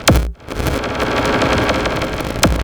Music > Solo percussion
Industrial Estate 16
techno, loop, soundtrack, 120bpm, chaos